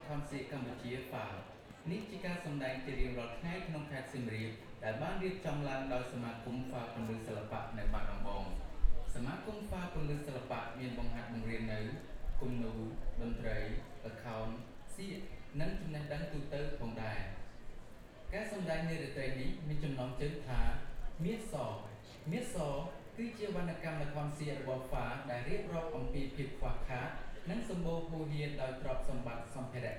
Soundscapes > Urban
Circus Music Performance, Siem Reap, Cambodia (May 22, 2019) - Part 1
Part 1 of live music from a circus show in Siem Reap, Cambodia. Percussion-heavy, energetic and theatrical rhythms supporting acrobatic performance.
circus, live, music, energetic, ambient, percussion, Siem, theatrical, Reap, Cambodia, performance